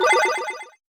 Sound effects > Electronic / Design
Designed coin pick up SFX created with Phaseplant and Vital.